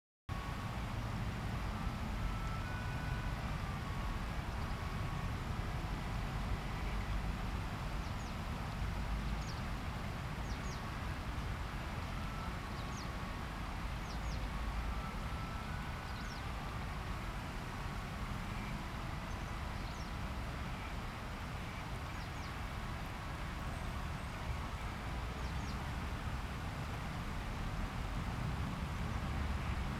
Soundscapes > Urban
Recorded at the riverbank of Kamogawa River in Kyoto, someone is playing pianica under a bridge. You can hear distant morning traffic and occasional birdsongs. Recorded by Teenage Engineering TP-7.
city, traffic